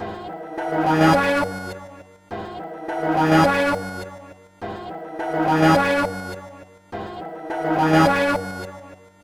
Instrument samples > Percussion
This 208bpm Drum Loop is good for composing Industrial/Electronic/Ambient songs or using as soundtrack to a sci-fi/suspense/horror indie game or short film.
Samples, Packs, Loop, Alien, Ambient, Weird, Industrial, Underground